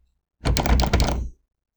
Sound effects > Objects / House appliances
Recorded on a redmi note 12, maybe not as high-quality as the professionals, but I tried.